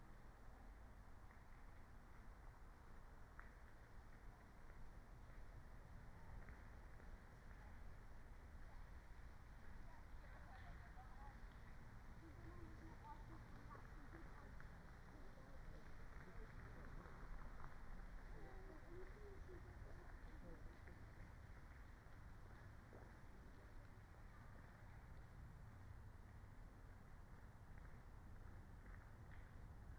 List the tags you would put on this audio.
Soundscapes > Nature
nature
alice-holt-forest
soundscape
natural-soundscape
phenological-recording
field-recording
raspberry-pi
meadow

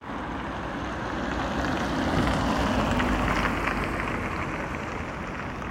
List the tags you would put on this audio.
Soundscapes > Urban
car
traffic
vehicle

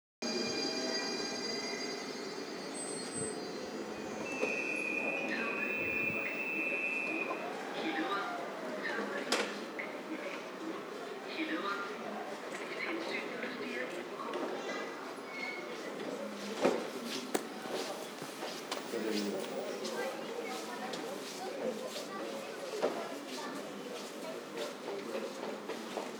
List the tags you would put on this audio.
Soundscapes > Urban
Announcement
Platform
Railway
Station
Train

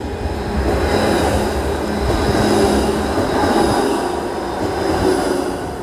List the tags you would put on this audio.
Sound effects > Vehicles
sunny
tram

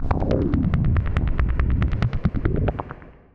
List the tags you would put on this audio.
Instrument samples > Synths / Electronic
low
subwoofer
lowend
wobble
wavetable
lfo
subs
synthbass
bass
clear
stabs
sub
bassdrop
drops
synth
subbass

